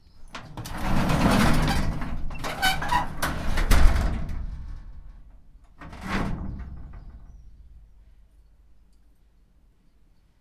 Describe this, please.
Sound effects > Objects / House appliances
Garage door close
Closing a metal garage door. Recorded with Zoom H1.
bang, close, closing, Dare2025-06A, garage-door, metal-door, shutting, slam